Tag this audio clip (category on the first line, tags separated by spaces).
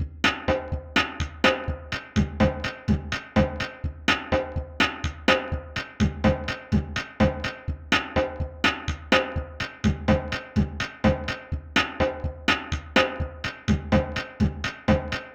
Music > Solo percussion
simple percussion drum 125bpm drum-loop sample rhythm loop beat